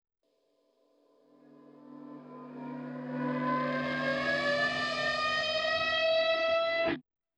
Music > Other
guitar riser with slide
guitar, riser, techno